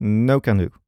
Speech > Solo speech
Date YMD : 2025 July 29 Location : Indoors France. Inside a "DIY sound booth" which is just a blanket fort with blankets and micstands. Sennheiser MKE600 P48, no HPF. A pop filter. Speaking roughly 3cm to the tip of the microphone. Weather : Processing : Trimmed and normalised in Audacity.
2025, Adult, Calm, FR-AV2, Generic-lines, Hypercardioid, july, Male, mid-20s, MKE-600, MKE600, no, no-can-do, Sennheiser, Shotgun-mic, Shotgun-microphone, Single-mic-mono, Tascam, VA, Voice-acting